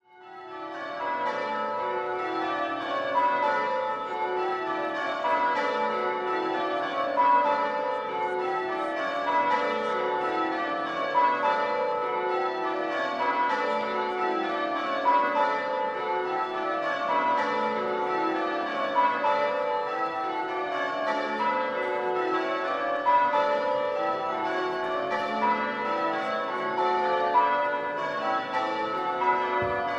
Soundscapes > Urban
A morning recording of the bells being rung at Lichfield Cathedral.
bells, cathedral, church, church-bells, outdoors, ringing